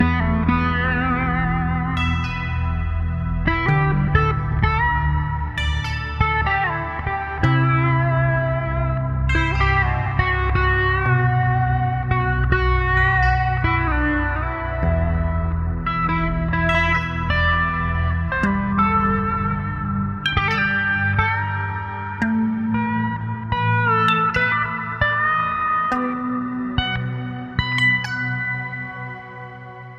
Music > Solo instrument
Happy sad Feeling guitar
Music express emotions and the real musician creates them through the instrument. Both guitars made with Fender Srat and Amp 5.
calm, guitar, intro